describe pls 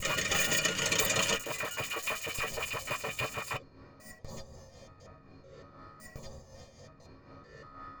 Sound effects > Electronic / Design
The Misfits Shop Warehouse
I finally sat down to explore Native Instruments Absynth sampler feature. I used samples from my, 'Broken Freezer Sample Pack' samples to make these noises. It is a low effort beginner pack. It is for documentation purposes but maybe you can find it useful.
christmas-sound-design, Christmas-themed, native-instruments-absynth, noise, sound-design